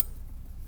Sound effects > Objects / House appliances
knife and metal beam vibrations clicks dings and sfx-097
Beam, Klang, metallic, Vibration, FX, Metal, Clang, Vibrate, SFX, Trippy, Foley, ting, ding, Wobble, Perc